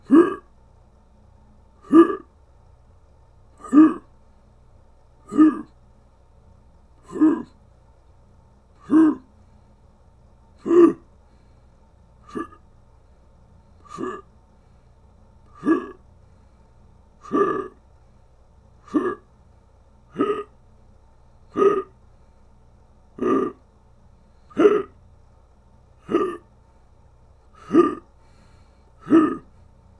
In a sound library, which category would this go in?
Sound effects > Human sounds and actions